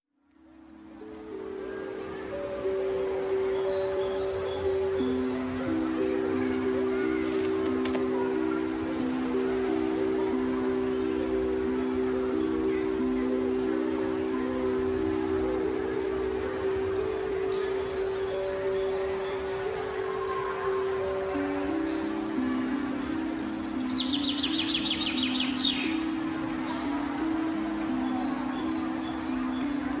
Soundscapes > Nature
I recorded this lovely, relaxing melody, played by a woman who was sitting next to a river, in one of my favorite parks. It was a beautiful day and such a magical moment...